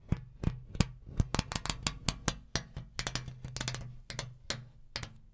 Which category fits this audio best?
Sound effects > Objects / House appliances